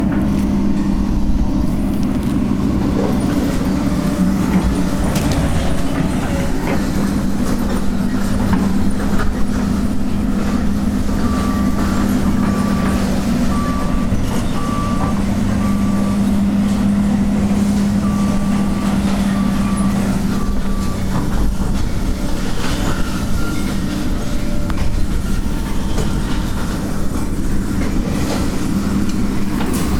Sound effects > Objects / House appliances
Junkyard Foley and FX Percs (Metal, Clanks, Scrapes, Bangs, Scrap, and Machines) 205
Smash, Junkyard, tube, Ambience, Robot, rattle, Robotic, FX, Clang, Machine, garbage, waste, Junk, Bang, Environment, dumping, Percussion, Metallic, SFX, dumpster, Bash, trash, Foley, Atmosphere, Perc